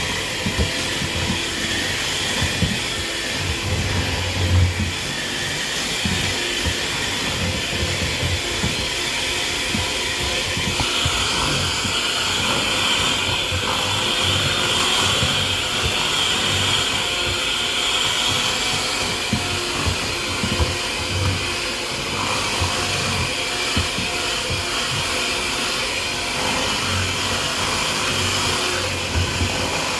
Sound effects > Objects / House appliances
FOODCook-Samsung Galaxy Smartphone Electric Mixer, Mixing Something Liquidy, Mushy on a Pot Nicholas Judy TDC
A mixer mixing something liquidy or mushy (i.e. Mashed Potatoes).
liquidy, mashed-potatoes, mix, mixer, mushy, Phone-recording